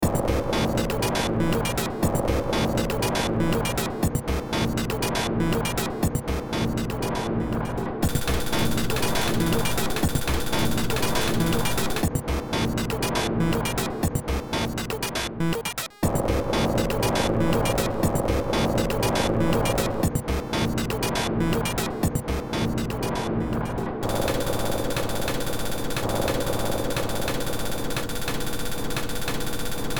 Music > Multiple instruments
Short Track #3963 (Industraumatic)
Ambient, Cyberpunk, Games, Industrial, Noise, Soundtrack, Underground